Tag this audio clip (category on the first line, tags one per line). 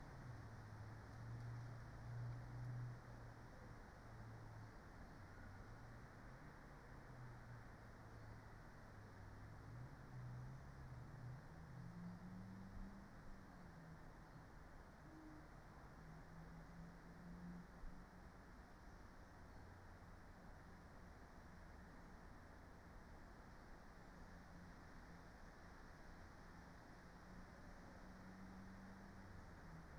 Soundscapes > Nature
artistic-intervention
natural-soundscape
field-recording
modified-soundscape
alice-holt-forest
soundscape
Dendrophone
data-to-sound
sound-installation